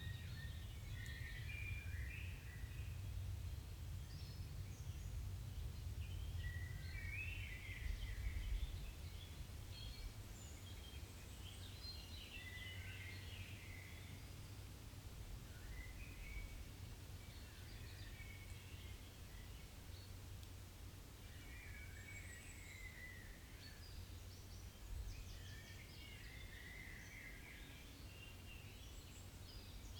Soundscapes > Nature
250418-15h00-18h07 - Gergueil Combe du Moulin woods
Subject : One out of three recordings of "Combe du Moulin" Between Gergueil and Poisot. Date YMD : 2025 04 18 Location : Gergueil France. Hardware : Zoom H5 stock XY capsule. Weather : Processing : Trimmed and Normalized in Audacity.
Cote-dor, spring, H5, Zoom-recorder, April, Rural, Zoom-brand, nature, Gergueil, Bourgogne-Franche-Comte, birds, ambience, field-recording, 2025, 21410, forret, ambiance, Forest, country-side, France